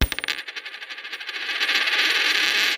Sound effects > Objects / House appliances
OBJCoin-Samsung Galaxy Smartphone, CU Quarter, Drop, Spin 01 Nicholas Judy TDC
drop, foley, Phone-recording, quarter